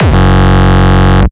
Instrument samples > Percussion
Frenchcore KickTesting 2 #F
Punch processed samples with Exit Kick and a Grv kick from FL studio from Flstudio original sample pack. Insanely processed with Mistortion vst. EQ, Saturated with Waveshaper.
Kick, Hardstyle, Hardcore, Frenchcore